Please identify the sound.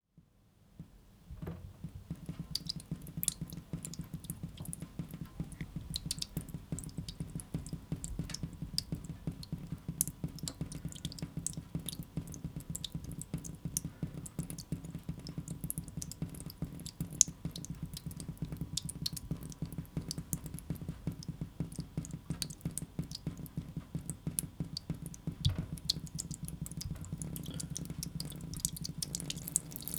Sound effects > Objects / House appliances
Llave de agua en lavaplatos Valparaiso
Percussive pattern of water on a sink.
water, Chile, Valparaiso, South, America, field, sink, recording